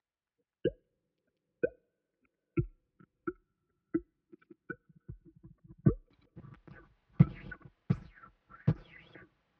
Sound effects > Human sounds and actions
Weird human sounds recorded with microphone